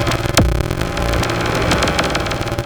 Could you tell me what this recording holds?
Music > Solo percussion
Industrial Estate 14
120bpm, Ableton, chaos, industrial, loop, soundtrack, techno